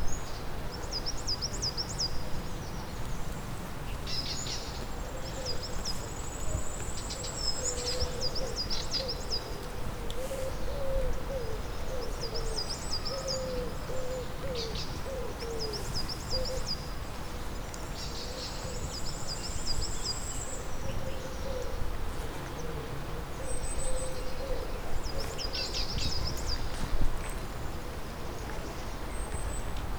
Soundscapes > Nature
20250312 Collserola Tortoraturca Mallblava Cueretablanca
CueretaBlanca, Mallblava, Collserola, TortoraTurca